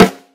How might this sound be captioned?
Instrument samples > Percussion
A&F Drum Co. 5.5x14 Steam Bent Solid Maple Shell Whisky Field Snare 2 unbassed
An unbassed (without bass) snare helpfile (file for snare timbre layering).
timbre-building, snare-layering, unbassed, backing-effect, snare, backlayer, snare-timbre